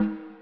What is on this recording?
Music > Solo percussion
Snare Processed - Oneshot 130 - 14 by 6.5 inch Brass Ludwig
acoustic, beat, brass, crack, drum, drumkit, drums, flam, fx, hit, hits, kit, ludwig, oneshot, perc, percussion, processed, realdrum, realdrums, reverb, rim, rimshot, rimshots, roll, sfx, snare, snaredrum, snareroll, snares